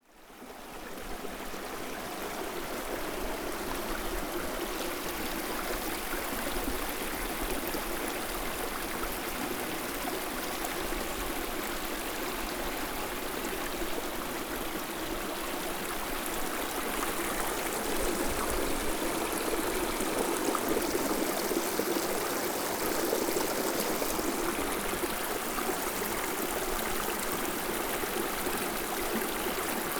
Soundscapes > Nature
Babbling Brook in Fern Canyon Redwoods
A babbling brook stream recorded in Fern Canoyn of the Redwoods on my Tascam D-05
Brook, Stream, Ambient, Natural, Water, Chill, ASMR, Foley, Redwoods, Rushing, Environment, Soothing, Current, Canoyn, Creek, Nature, Ambience